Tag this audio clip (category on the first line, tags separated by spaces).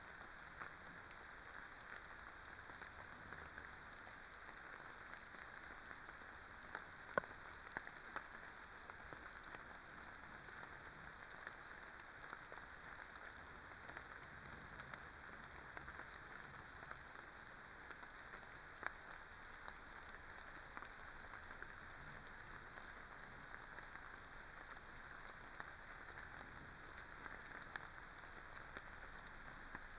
Soundscapes > Nature
field-recording raspberry-pi sound-installation Dendrophone weather-data data-to-sound artistic-intervention phenological-recording natural-soundscape modified-soundscape alice-holt-forest nature soundscape